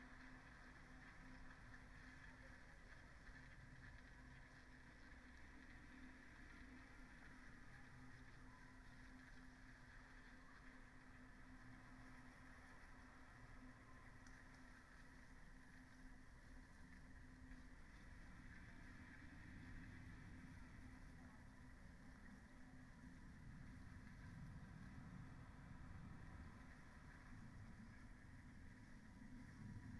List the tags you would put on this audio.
Soundscapes > Nature
alice-holt-forest data-to-sound natural-soundscape sound-installation weather-data

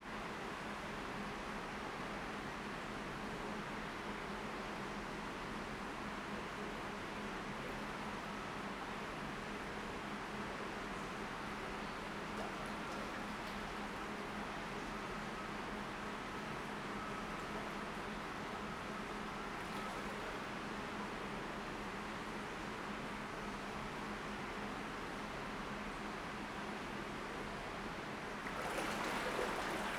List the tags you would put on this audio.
Soundscapes > Indoors
Ambient pool swimming Water

